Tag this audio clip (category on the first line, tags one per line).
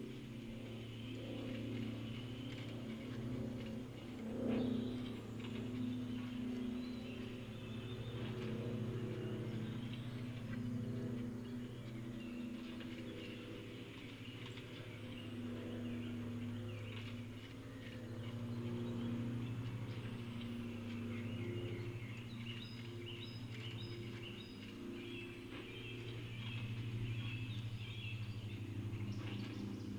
Soundscapes > Nature
sound-installation
soundscape
natural-soundscape
data-to-sound